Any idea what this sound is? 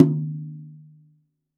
Music > Solo instrument
drum kit samples recorded in my studio and processed via Reaper